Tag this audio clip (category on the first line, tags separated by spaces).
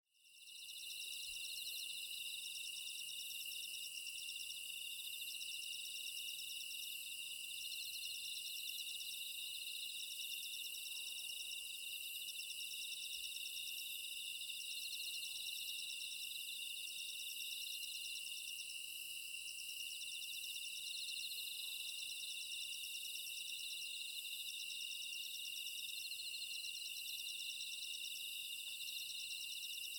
Soundscapes > Nature
ambience crickets field-recording insects korea night rural summer yeongdong